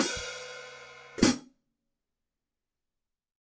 Music > Solo instrument
Cymbal oneshot from a collection of cymbal drum percussion pack recorded with Sure microphones and reaper. Processed with Izotope RX Spectral denoise